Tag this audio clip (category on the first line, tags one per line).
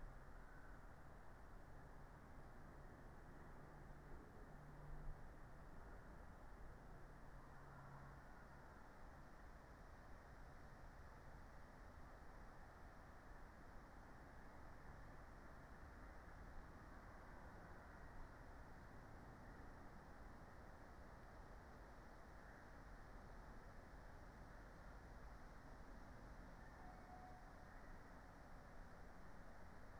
Soundscapes > Nature
data-to-sound
phenological-recording
Dendrophone
weather-data
alice-holt-forest
raspberry-pi
soundscape
nature
modified-soundscape
artistic-intervention
sound-installation
field-recording
natural-soundscape